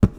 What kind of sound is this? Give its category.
Sound effects > Objects / House appliances